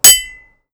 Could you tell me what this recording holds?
Sound effects > Objects / House appliances
FOODGware-Blue Snowball Microphone, CU Ceramic Mug Ding 01 Nicholas Judy TDC
A ceramic mug ding.
Blue-brand, Blue-Snowball, ceramic, ding, foley, mug